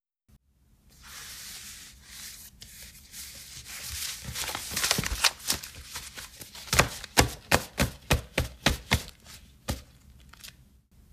Sound effects > Objects / House appliances
Gathering paper spread out and straightening it together